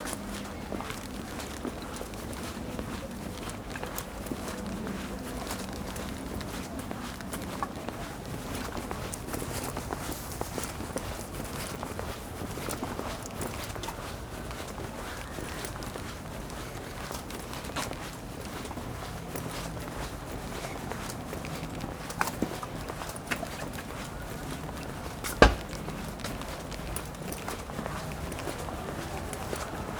Soundscapes > Urban
Walking down an empty street semi-fast. Featuring rustling of a winter coat, slight wind, distant voices and chatter and clicks.